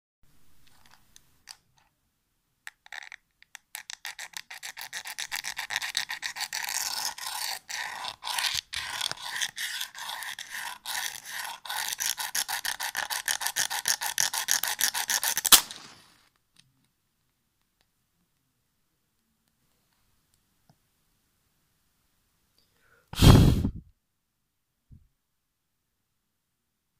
Sound effects > Objects / House appliances
Match Strike on Box – Lo-fi Sound
This snippet captures the abrasive sound of a match being struck against its box, recorded with an older mobile phone. The lo-fi quality adds a raw, repetitive scratch that creates a sharp, unpleasant texture, followed by a quick puff as the match is extinguished. Perfect for adding an irritating, tactile element to your projects.